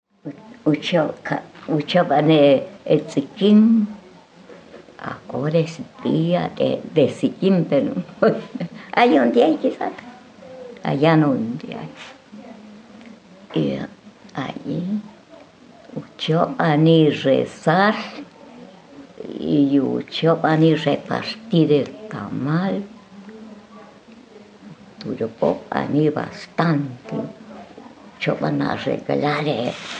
Speech > Solo speech
Doña Rosaura habla Chorti Honduras Copan
Voice of Doña Rosaura, one of the last speakers of the "Chorti" indigenous language in Copan Ruinas, Honduras. His project tries to record the sounds of the last speakers of the almost extinct language named Chorti, from Mayan heritage.
ancestral, chorti, copan, field-recording, honduras, indigenous, language